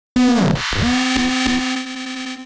Sound effects > Electronic / Design
Optical Theremin 6 Osc Shaper Infiltrated-015
Alien, Analog, Chaotic, Crazy, DIY, EDM, Electro, Electronic, Experimental, FX, Gliltch, IDM, Impulse, Loopable, Machine, Mechanical, Noise, Oscillator, Otherworldly, Pulse, Robot, Robotic, Saw, SFX, strange, Synth, Theremin, Tone, Weird